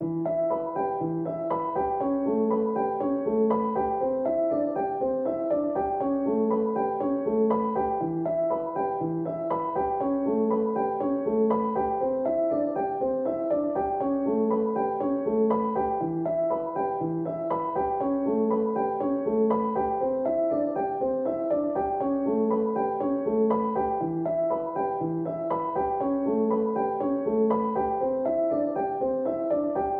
Music > Solo instrument
Piano loops 190 octave long loop 120 bpm
120, 120bpm, free, loop, music, piano, pianomusic, reverb, samples, simple, simplesamples